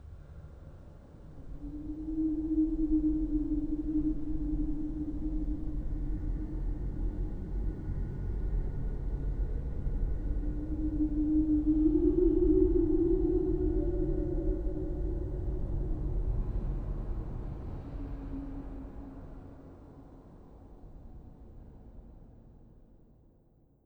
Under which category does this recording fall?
Soundscapes > Other